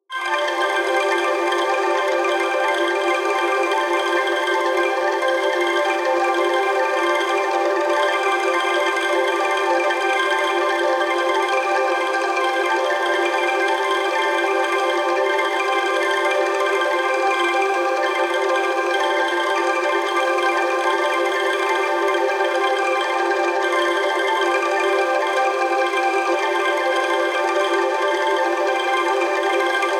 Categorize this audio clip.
Soundscapes > Synthetic / Artificial